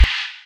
Instrument samples > Percussion
China Yunnan 2
Very good when used in music 1 octave lower. Remind me to use it!
Stagg, sinocrash, Soultone, cymbal, Chinese, bang, flangcrash, crash, crunch, metal, Sabian, Zildjian, metallic, crack, UFIP, China, Meinl, smash, clash, boom, slam, Paiste